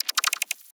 Sound effects > Electronic / Design
ROS-FX One Shoot 1-Voxed
Synthed with Oigrandad 2 granular. Sample used from bandlab.
FX, Organic